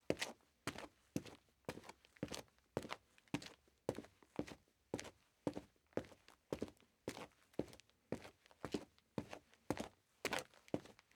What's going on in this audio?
Sound effects > Human sounds and actions
Footsteps sneakers sample.